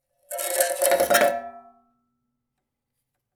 Sound effects > Other mechanisms, engines, machines
Dewalt 12 inch Chop Saw foley-033
Samples of my Dewalt Chopsaw recorded in my workshop in Humboldt County California. Recorded with a Tascam D-05 and lightly noise reduced with reaper
Tools, Saw, Foley, Shop, Perc, Blade, Scrape, FX, Woodshop, Percussion, Chopsaw, Tooth, Workshop, Tool, Metal, Circularsaw